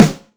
Instrument samples > Percussion
jazzsnare amateur 2 muffled
Pearl, snare, jazz-snare, DW, jazzsnare, Brady, jazz, strike, Sonor, Noble, drums, Slingerland, plainsnare, metro, counter, Mapex, drum, metronome, countsnare, Ludwig, Gretsch, beatcount, unembellished-snare, hit, beatsnare, timpano, Cooley, oldsnare, percussion, Tama